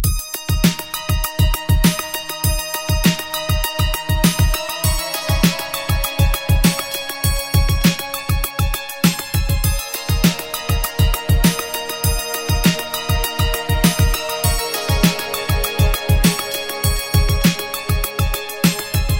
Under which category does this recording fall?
Music > Multiple instruments